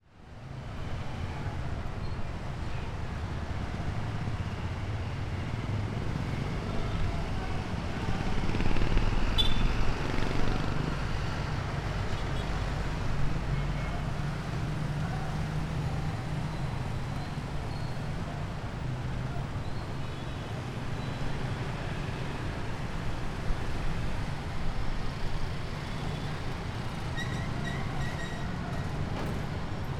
Soundscapes > Urban
250823 161746 PH Traffic in Calapan city
Traffic in Calapan city, Philippines. (Take 1) I made this recording from a balcony located in Calapan city (Oriental Mindoro, Philippines), right in front of Xentro Mall, at the end of the afternoon. One can hear quite heavy traffic with lots of vehicles (cars, motorcycles, some trucks and some jeepneys) passing by and onking at times, as well as the voices of some people coming in or going out the mall. From time to time, one can also hear men shouting to invite passengers to come in their jeepney or tricycle, as well as a bus honking (starting at #9:49), calling passengers going to Bulalacao. At #6:45, a vehicle passes by with some loud music. Recorded in August 2025 with a Zoom H5studio (built-in XY microphones). Fade in/out applied in Audacity.
atmosphere, Calapan-city, dog, general-noise, horn, motorcycles, street, town, trucks, vehicles